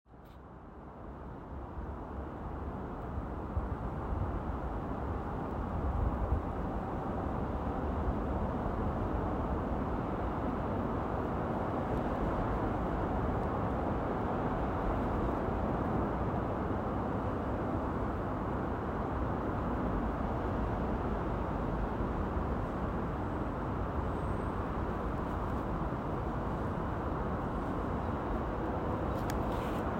Soundscapes > Urban
Tokyo Steet at night
Nihombashikoamicho in Tokyo, Japan at night. 9/1/25
ambience, city, nighttime